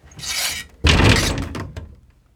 Sound effects > Objects / House appliances
Indoor Door with plastic glass window XY 3
Subject : A door inside the house, with a plasticky glass window. Date YMD : 2025 04 22 Location : Gergueil France Hardware : Tascam FR-AV2 and a Rode NT5 microphone in a XY setup. Weather : Processing : Trimmed and Normalized in Audacity. Maybe with a fade in and out? Should be in the metadata if there is.
Dare2025-06A, FR-AV2, hinge